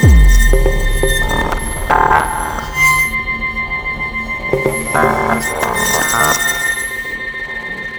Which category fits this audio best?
Music > Multiple instruments